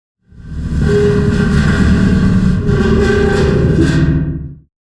Sound effects > Other
Raw Industrial Recordings-Scratching Metal 003
sfx, sound, sounds, metal, scraping, raw, clang, abstract, grungy, noise, foley, rust, mechanical, distorted, harsh, drone, cinematic, impact, metallic, textures, industrial, effects, experimental, rusted, scratching, found